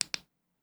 Sound effects > Objects / House appliances
Flashlight Click On
Single mechanical button press recorded close-up. Medium volume, minimal background noise. Ideal for flashlight or small device button. Its Very easy to clean this sound up, some noise reduction and you're off!, left the file a raw as it can be.
buttonsound, flashlight, light, mechanical, poweron, press, switch